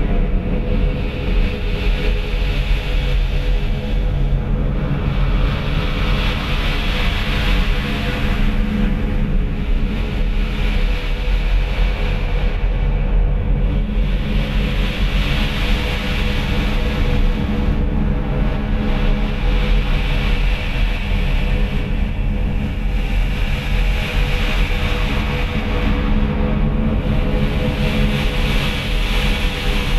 Sound effects > Experimental
"One wave after another pelted the outer shields of our compound. Sounds I haven't heard since." For this sound, I recorded ambient audio in my apartment. And used Audacity to produce the finished piece.